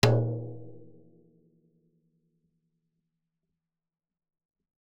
Music > Solo percussion
Med-low Tom - Oneshot 10 12 inch Sonor Force 3007 Maple Rack
acoustic, beat, drum, drumkit, drums, flam, kit, loop, maple, Medium-Tom, med-tom, oneshot, perc, percussion, quality, real, realdrum, recording, roll, Tom, tomdrum, toms, wood